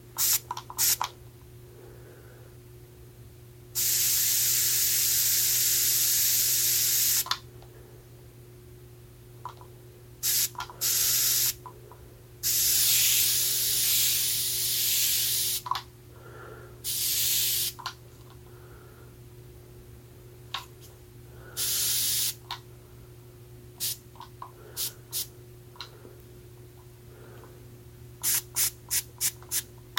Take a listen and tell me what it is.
Sound effects > Objects / House appliances
AIRBrst-Samsung Galaxy Smartphone, CU Air Freshener, Spray, Trigger Clicks Nicholas Judy TDC
An air freshener spraying with trigger clicks.